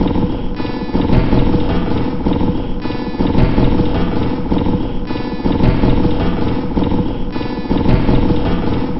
Instrument samples > Percussion
This 213bpm Drum Loop is good for composing Industrial/Electronic/Ambient songs or using as soundtrack to a sci-fi/suspense/horror indie game or short film.
Underground, Weird, Packs, Alien, Ambient, Samples